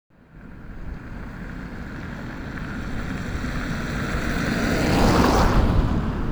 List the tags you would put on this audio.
Sound effects > Vehicles
traffic
vehicle

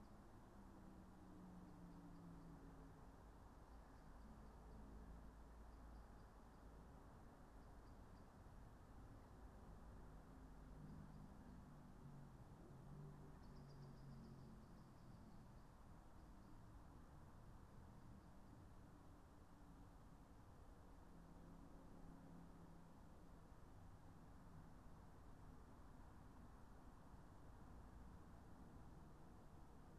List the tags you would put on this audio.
Soundscapes > Nature
raspberry-pi
sound-installation
modified-soundscape
nature
field-recording
weather-data
Dendrophone
phenological-recording
soundscape
data-to-sound
artistic-intervention
natural-soundscape
alice-holt-forest

